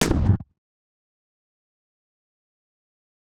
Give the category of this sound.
Sound effects > Experimental